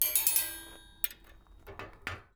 Sound effects > Objects / House appliances
Junkyard Foley and FX Percs (Metal, Clanks, Scrapes, Bangs, Scrap, and Machines) 47
Clang, Dump, garbage, Junk, scrape, Robotic, Bash, Ambience, Perc, rubbish, rattle, Percussion, Bang, Machine, Metallic, Smash, SFX, Robot, waste, dumping, tube, dumpster, Foley, Clank, Environment, FX, trash, Junkyard, Metal, Atmosphere